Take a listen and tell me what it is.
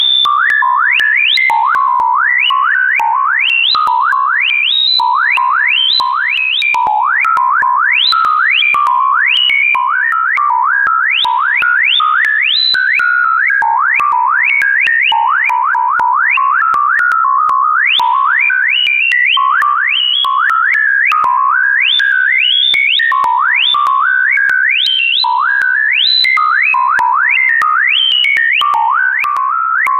Soundscapes > Synthetic / Artificial
Space Alien Speaking 001
Space Alien speaking Done with Digitakt 2
alien, artificial, galaxy, Soundscape, space, speech